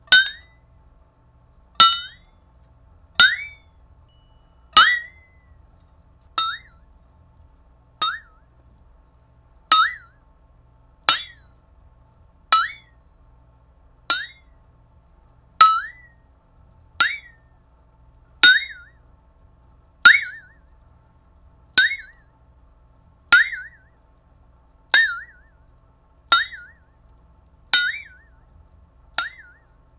Instrument samples > Percussion
TOONBoing-Blue Snowball Microphone, CU Flexatone, Pings Nicholas Judy TDC
Flexatone boings and pings.
Blue-brand, Blue-Snowball, boing, cartoon, flexatone, ping